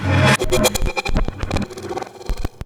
Music > Solo percussion
Industrial Estate 13
chaos, loop, soundtrack, 120bpm, Ableton, industrial, techno